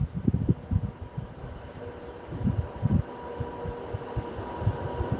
Sound effects > Vehicles
tram approaching from distance occasional wind
Tram approaching to pass by at a steady speed, with some occasional wind disturbing the recording. Recorded from an elevated position, in an urban environment, using the default device microphone of a Samsung Galaxy S20+. TRAM: ForCity Smart Artic X34
approaching, tram, transport, urban, windy